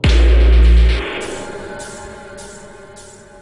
Sound effects > Electronic / Design
Impact Percs with Bass and fx-004
bash, brooding, crunch, deep, foreboding, low, oneshot, perc